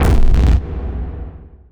Instrument samples > Synths / Electronic
CVLT BASS 30
clear, synthbass, subwoofer, synth, subs, lowend, drops, lfo, subbass, low, wobble, bassdrop, stabs, bass, sub, wavetable